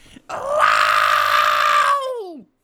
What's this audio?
Sound effects > Other
videogame death scream
videogame, scream